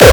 Instrument samples > Percussion
Frechcore Punch 3
Sample layered from Flstudio original sample pack: 909 kick, Minimal Kick 06, and a Grv kick. Plugin used: ZL EQ, Waveshaper.
Hardstyle, Frechcore, Punch, Kick, Hardcore